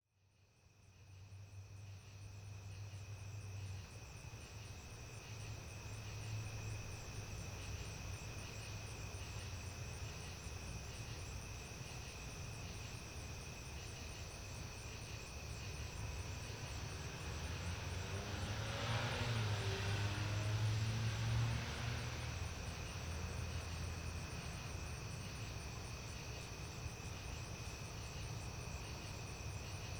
Soundscapes > Nature
NightFallNhood version 2 9 28 2025
A peaceful, calming outdoor small-town soundscape. This was recorded on September 8 2025 at 7:30 at night in a small Midwestern Town. For my equipment, I used a dynamic microphone; the Heil PR40 going into my Sound Devices MixPre 3 version ii. Since it is a dynamic microphone I had my gain cranked to 73 decibels. But, once again the pristine Sound Devices Preamps were up to the task. Towards the end of this recording, some crickets come a little bit closer to the microphone and you can hear them, layered over the surrounding nightscape. These handsome critters begin their singing at 14 minutes and 5 seconds into this Mono recording. Enjoy this slice of Sunday night outside quiet. In this world we live in, I strongly believe quiet, like this, is a necessity.
Crickets, Field-Recording, Nature, Night, Peaceful, Small-Town, Soothing